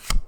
Sound effects > Objects / House appliances
GAMEMisc-Blue Snowball Microphone Card, Swipe from Other Hand 02 Nicholas Judy TDC
A card being swiped from another hand.